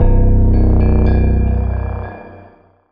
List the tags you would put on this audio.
Instrument samples > Synths / Electronic
bass
bassdrop
clear
drops
lfo
low
lowend
stabs
sub
subbass
subs
subwoofer
synth
synthbass
wavetable
wobble

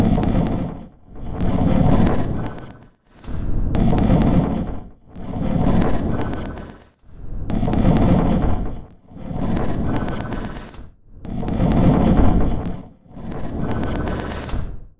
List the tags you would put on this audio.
Instrument samples > Percussion
Alien
Loopable
Dark
Drum
Ambient

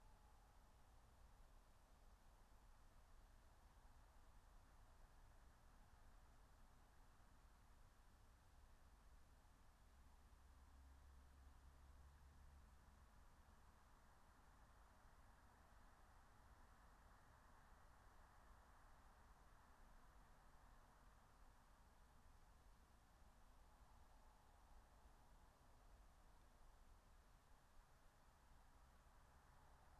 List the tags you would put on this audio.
Soundscapes > Nature
alice-holt-forest
field-recording
meadow
soundscape
natural-soundscape
phenological-recording
raspberry-pi
nature

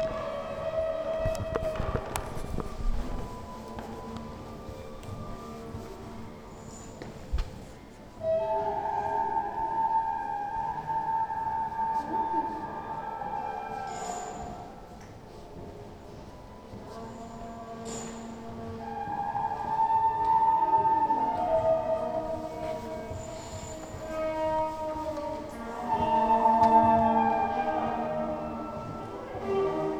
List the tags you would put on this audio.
Soundscapes > Urban
berlin
fieldrecorder
traveling
germany
public-transport

